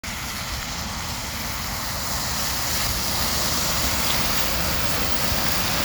Soundscapes > Urban
A bus passing the recorder in a roundabout. The sound of the bus engine and tires can be heard with sound of rain. Recorded on a Samsung Galaxy A54 5G. The recording was made during a windy and rainy afternoon in Tampere.